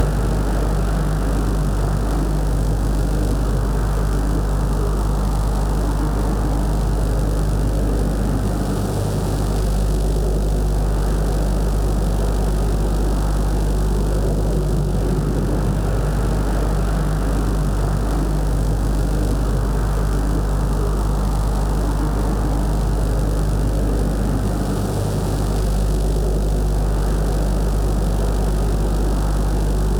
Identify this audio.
Sound effects > Other mechanisms, engines, machines
Powerline Humming Loop
A loop I made from recording a humming, sizzeling Powerline. Recorded with my Tascam DR40X. Edited with heavy compression and some EQing. In the Background are noises from vehicles and some flying airplanes.